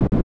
Sound effects > Electronic / Design
CLICKY ELECTRIC SYNTHETIC HIT
BEEP, BOOP, CHIPPY, CIRCUIT, COMPUTER, DING, ELECTRONIC, EXPERIMENTAL, HARSH, HIT, INNOVATIVE, OBSCURE, SHARP, SYNTHETIC, UNIQUE